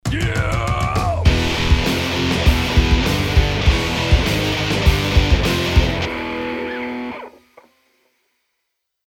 Music > Multiple instruments
Background music score
I made this as background music when showing the players final score after the player finished a level. The song is made in Cubase with vocals(only the beginning), 3 recorded guitars, ABPL 2 VST(bas) and Grove agent(drums) at 120bpm. The track is meant to be simple with a feeling of 90s action movies. The vocal on the track is me screaming "Yeah!".
Heavy, Rock, Voice